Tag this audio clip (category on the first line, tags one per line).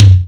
Instrument samples > Percussion
16x16-inch
16x16-inches
bubinga
death
death-metal
drum
drumset
DW
floor
floortom
floortom-1
heavy
heavy-metal
Ludwig
Majestic
metal
Pearl
pop
rock
sapele
sound-engineering
Tama
Tama-Star
thrash
thrash-metal
timpano
tom
tom-tom
unsnared